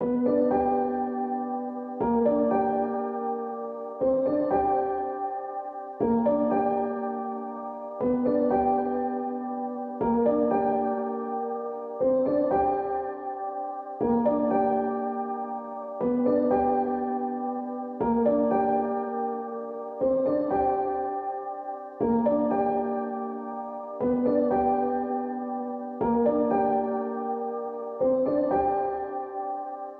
Music > Solo instrument
Piano loops 095 efect 4 octave long loop 120 bpm
loop, 120